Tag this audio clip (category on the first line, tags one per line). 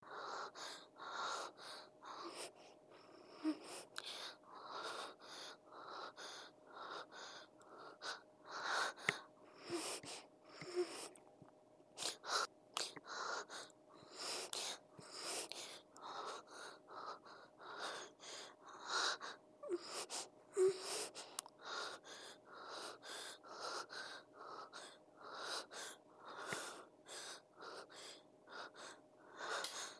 Sound effects > Human sounds and actions
female
female-voice
italian
masturbation
vocal